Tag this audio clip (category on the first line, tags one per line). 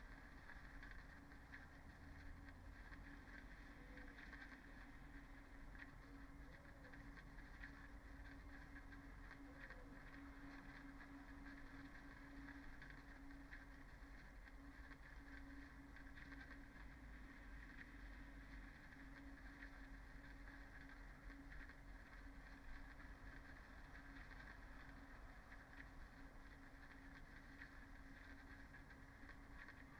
Soundscapes > Nature
sound-installation
artistic-intervention
raspberry-pi
Dendrophone
data-to-sound
nature
modified-soundscape
natural-soundscape
phenological-recording
soundscape
field-recording
alice-holt-forest
weather-data